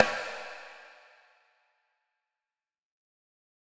Music > Solo percussion
Snare Processed - Oneshot 41 - 14 by 6.5 inch Brass Ludwig
rimshots, sfx, perc, realdrums, processed, fx, kit, drum, ludwig, hits, realdrum, beat, oneshot, drumkit, acoustic, snaredrum, brass, percussion, snare, drums, rimshot, reverb, crack, snares, rim, snareroll, hit, roll, flam